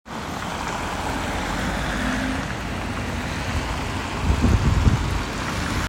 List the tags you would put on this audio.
Sound effects > Vehicles
automobile
car
outside
vehicle

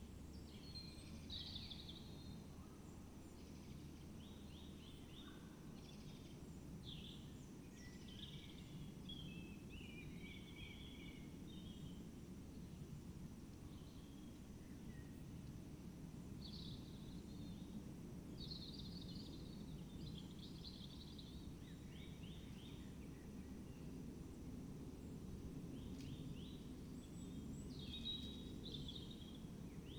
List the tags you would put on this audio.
Soundscapes > Nature
alice-holt-forest
artistic-intervention
Dendrophone
field-recording
modified-soundscape
natural-soundscape
sound-installation
soundscape
weather-data

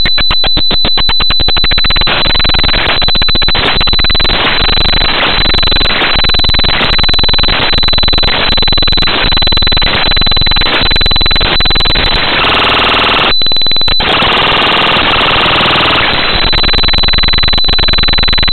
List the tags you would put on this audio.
Sound effects > Experimental
arctifact
data
digital
glitch
noise
sine
tone

